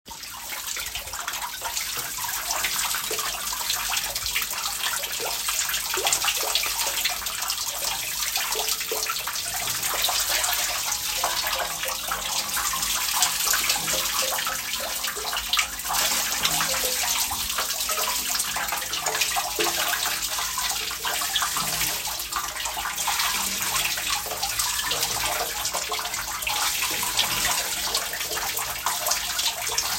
Soundscapes > Other
Water falling into a tank
Date and Time: 17/05/2025 9h17 am Venue: Moreira do Lima, Ponte do Lima Sound type: Signal- usually keynote sound but deliberately emphasized Type of microphone used: Iphone 14 omnidirectional internal microphone (Dicafone was the application used) Distance from sound sources: 1m
falling, nature, tank, water